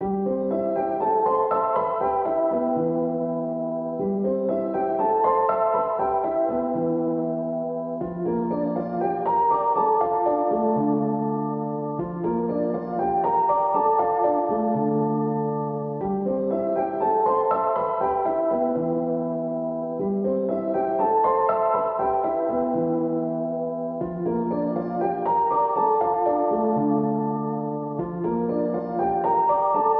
Music > Solo instrument
Piano loops 195 efect 4 octave long loop 120 bpm
120, 120bpm, free, loop, music, piano, pianomusic, reverb, samples, simple, simplesamples